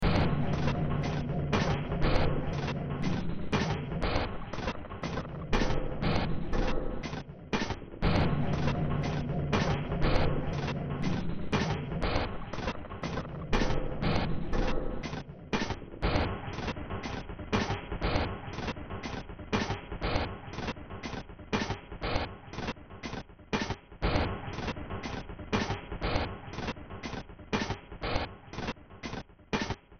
Music > Multiple instruments
Demo Track #3018 (Industraumatic)
Sci-fi, Games, Horror, Soundtrack, Cyberpunk, Ambient, Industrial, Noise, Underground